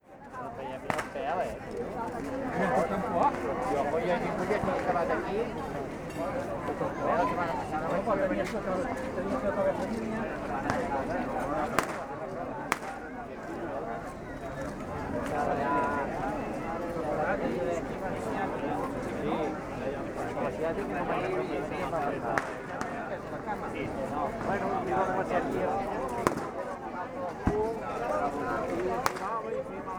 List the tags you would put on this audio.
Soundscapes > Urban
atmosphere
lively
tourists
walla
hubbub
street
restaurants
ambience
fireworks
crowd
field-recording
happy
voices
Spain
evening
tourist-spot
San-Juan
Coma-Ruga
firecrackers
outdoor
soundscape
urban

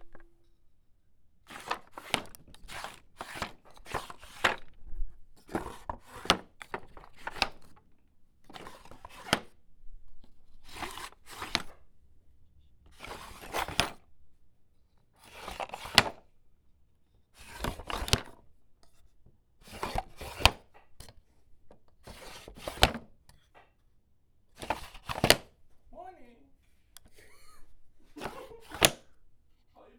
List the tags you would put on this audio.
Sound effects > Objects / House appliances
drawer
dresser
open

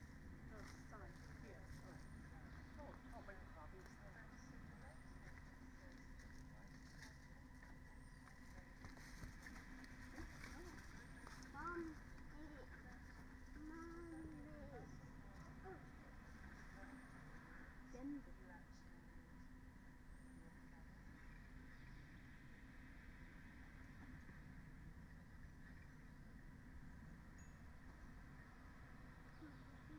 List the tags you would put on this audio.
Soundscapes > Nature
modified-soundscape
weather-data
alice-holt-forest
nature
field-recording
natural-soundscape
raspberry-pi
soundscape
data-to-sound
artistic-intervention
Dendrophone
sound-installation
phenological-recording

